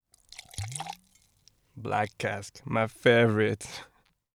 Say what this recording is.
Speech > Solo speech
Surfer dude - Black cask my favoruite
Subject : Recording my friend going by OMAT in his van, for a Surfer like voice pack. Here a line when I popped out the.PLANTATION RUM Black Cask Barbade Venezuela Date YMD : 2025 August 06 Location : At Vue de tout albi in a van, Albi 81000 Tarn Occitanie France. Shure SM57 with a A2WS windshield. Weather : Sunny and hot, a little windy. Processing : Trimmed, some gain adjustment, tried not to mess too much with it recording to recording. Done inn Audacity. Some fade in/out if a oneshot.
2025, 20s, A2WS, Adult, August, Cardioid, Dude, English-language, France, FR-AV2, In-vehicle, Male, mid-20s, Mono, RAW, rum, Single-mic-mono, SM57, Surfer, Tascam, VA, Voice-acting